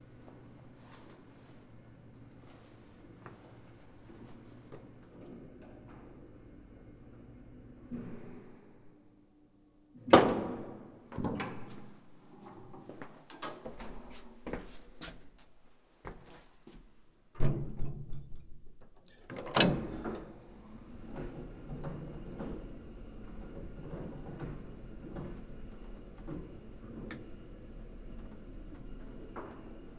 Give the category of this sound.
Soundscapes > Indoors